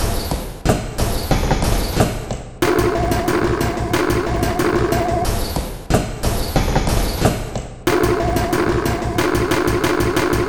Instrument samples > Percussion
This 183bpm Drum Loop is good for composing Industrial/Electronic/Ambient songs or using as soundtrack to a sci-fi/suspense/horror indie game or short film.
Ambient, Soundtrack, Packs, Loopable, Weird, Drum